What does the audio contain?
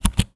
Sound effects > Objects / House appliances
FUJITSU Computers Keyboard - spacebar Press Mono
Subject : A all white FUJITSU keyboard key being pressed. Date YMD : 2025 03 29 Location : Thuir Theatre, South of France. Hardware : Zoom H2N, MS mode. Using the middle side only. Handheld. Weather : Processing : Trimmed and Normalized in Audacity.
Close-up, H2N, individual-key, Key, Keyboard, key-press, Zoom-Brand, Zoom-H2N